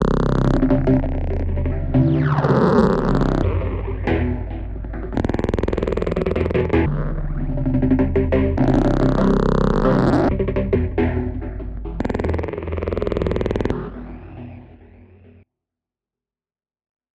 Sound effects > Electronic / Design
Dark Synth Loop 140 BPM
Dark Synth loop created using a Native Instruments Massive sound pitched down.
Synthesis, Dark, Synth, Loop